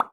Sound effects > Human sounds and actions
Bubble Pop Sound
This sound created by my mouth.
bubble, short, real, press